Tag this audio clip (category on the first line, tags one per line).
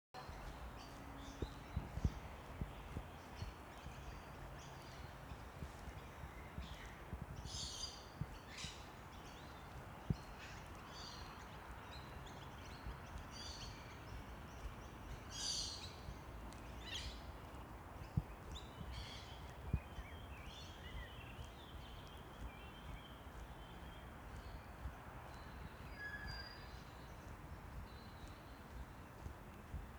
Soundscapes > Nature
Dawn
Peaceful